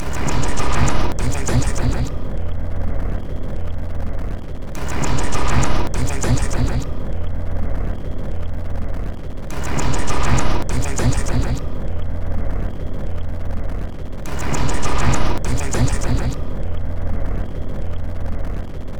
Instrument samples > Percussion
This 101bpm Drum Loop is good for composing Industrial/Electronic/Ambient songs or using as soundtrack to a sci-fi/suspense/horror indie game or short film.
Ambient, Loop, Loopable, Weird, Dark, Samples, Soundtrack, Industrial, Underground, Drum, Packs, Alien